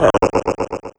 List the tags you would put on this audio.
Sound effects > Electronic / Design
sorcerer fantasy prankster prank effect spell abstract magical funny magic video-game magician gaming sound-design jester weird ability fun dungeons-and-dragons sci-fi strange sorcery drain game negative scifi RPG cursed-item dnd

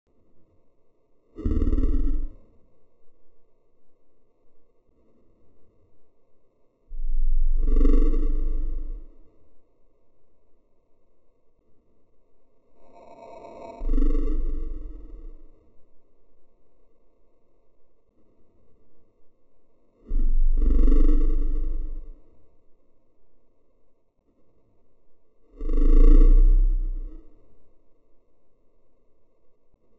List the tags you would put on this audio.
Sound effects > Animals
beast monster weird growl horror dinosaur

